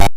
Sound effects > Electronic / Design
Optical Theremin 6 Osc dry-074
Infiltrator, Electro, Scifi, Otherworldly, Dub, Handmadeelectronic, Optical, Spacey, Sci-fi, Theremin, DIY, Robot, Glitch, Instrument, Noise, Electronic, Glitchy, Digital, Experimental, Bass, SFX, noisey, Alien, Robotic, Trippy, FX, Analog, Synth, Theremins, Sweep